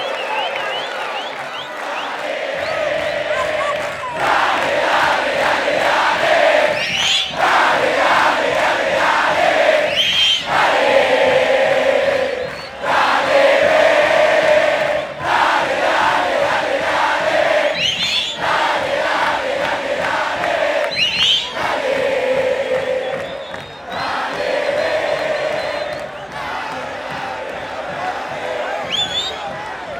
Soundscapes > Urban
CLUB ATLETICO BELGRANO - CAB - HINCHADA
CLUB ATLETICO BELGRANO VS BOCA. FECHA 12/04/2025. POPULAR. GRABADO CON RODE NT5 EN CONFIGURACION ORTF Y SOUNDDEVICES MIXPRE3 -------------------------------------------------------------------------------------------------------------- Club Atletico Belgrano vs. Boca Juniors. Date: April 12, 2025. PIRATE POPULR SINGS. Recorded with a Rode NT5 in the Ortf configuration and SoundDevices MixPre3.
BELGRANO, SOCCER, C, FOOTBALL, CELESTE, PUBLIC, CORDOBA, STADIUM, CLUB-ATLETICO-BELGRANO, CAB, FUTBOL, PIRATAS, PIRATES, PIRATES-OF-ALBERDI